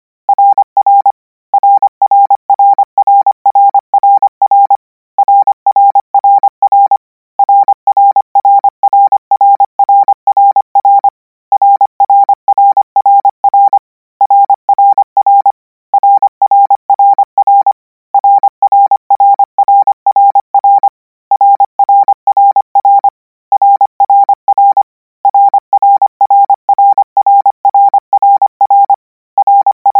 Sound effects > Electronic / Design
Koch 03 R - 200 N 25WPM 800Hz 90%
Practice hear letter 'R' use Koch method (practice each letter, symbol, letter separate than combine), 200 word random length, 800 Hz, 90% volume.
letters, codigo, radio